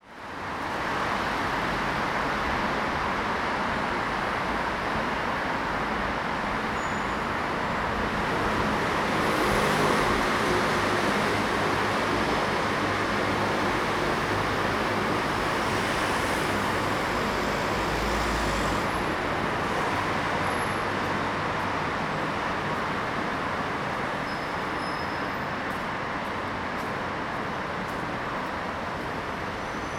Sound effects > Vehicles
AMBPubl Cinematis FieldRecording TunnelAmbience Close
A deep, reverberant tunnel ambience dominated by heavy traffic rumble and passing vehicle lows. Recorded with a Zoom H1. --- The big Year-End Holiday discount is here. Get my entire discography at 90% off. Enjoy — and have fun!
ambience, car, cars, city, environmental, field-recording, low-end, passing, reverberant, rumble, traffic, tunnel, urban, vehicles